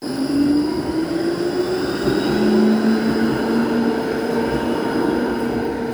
Soundscapes > Urban
voice 1 14-11-2025 tram
What: Tram passing by sound Where: in Hervanta, Tampere on a cloudy day Recording device: samsung s24 ultra Purpose: School project
Rattikka, Tram, TramInTampere